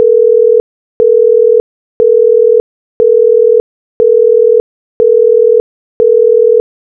Sound effects > Electronic / Design
dial tone2
Improoved landline phone 'disconnect tone' loop made with Python, (with numpy) combining 2 frequencies (440hz and 480hz).
alert, calling, cell, cell-phone, dial, disconnect, electronic, landline-phone, mobile, office, phone, ring, ring-tone, ringtone, stand, telephone, tone